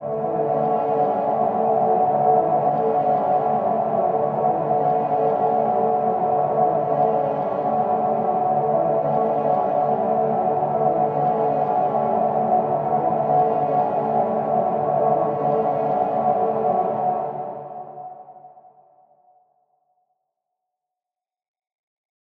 Instrument samples > Other
intro stem from my track I've Made a Mistake , 113 BPM made using multiple sterings from flex in fl studio, i made the original sample and the reversed it to get this sound
113, orchestral, sample, stems, strings